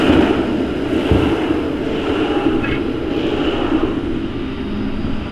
Sound effects > Vehicles
Tram00079342TramPassingBy
Audio of a tram passing nearby. Recording was taken during winter. Recorded at Tampere, Hervanta. The recording was done using the Rode VideoMic.
winter, tram, vehicle, tramway, field-recording, city, transportation